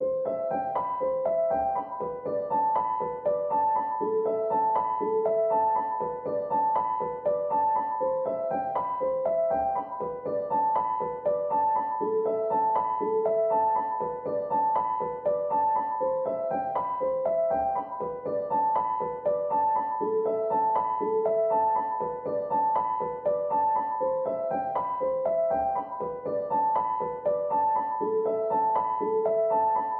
Music > Solo instrument
Piano loops 192 octave up long loop 120 bpm
loop, free, pianomusic, samples, simplesamples, piano, reverb, 120bpm, music, simple, 120